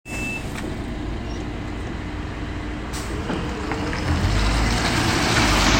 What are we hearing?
Soundscapes > Urban
Bus door closing and bus leaving 10
Where: Tampere Keskusta What: Sound of a bus door closing and bus leaving bus stop Where: At a bus stop in the morning in a calm weather Method: Iphone 15 pro max voice recorder Purpose: Binary classification of sounds in an audio clip